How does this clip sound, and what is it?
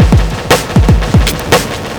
Music > Solo percussion
Industrial Estate 29
chaos, loops, techno, soundtrack, Ableton, industrial, 120bpm